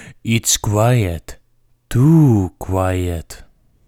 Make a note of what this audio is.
Speech > Solo speech
It’s quiet… too quiet
calm, human, male, quiet